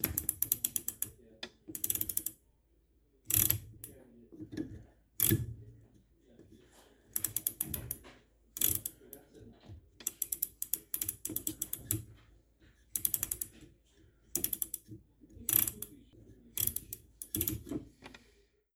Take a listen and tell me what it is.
Sound effects > Objects / House appliances
MECHRtch-Samsung Galaxy Smartphone Washing Machine, Ratchet Dial, Turning Nicholas Judy TDC
A washing machine ratchet dial turning.